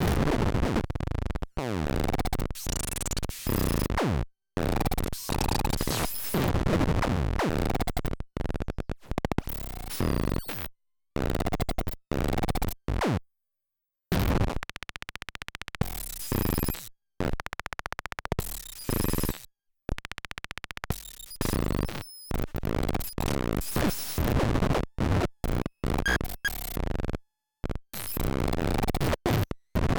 Sound effects > Electronic / Design
Harsh digital noise from a device called "The Solarcrack"
Warning: loud and harsh sounds. Around 2010, I took part in a large secret santa exchange. I was gifted a homemade device from a stranger. It's an Altoids mint with a solar panel glued to it. Scratched on the tin is the word "SOLARCRACK". Inside, a circuit board and some buttons hot-glued to the bottom. There's a headphone jack on the side. The device reacts in some way with light. I've never figured out exactly what the buttons do, but they affect the sound too. This file is free for you to use and adapt as you please. The only processing I've done is a small bit of limiting and volume/pan adjustments. This recording is neither L/R or M/S stereo encoded. The two channels of this sound file are largely uncorrelated.